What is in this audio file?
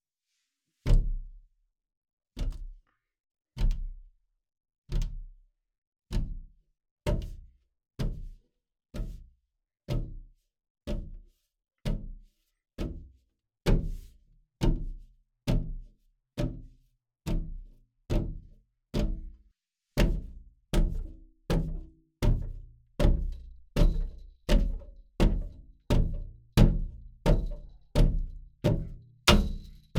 Sound effects > Other
Banging against window (Roomy)
Sounds of banging against a window. We're seeking contributors!
banging, hitting, nsfw, pounding, sex, window